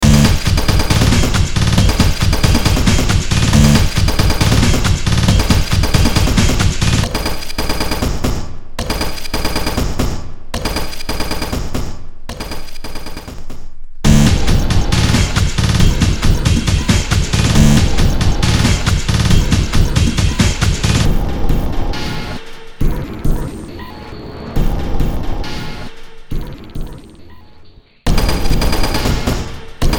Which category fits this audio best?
Music > Multiple instruments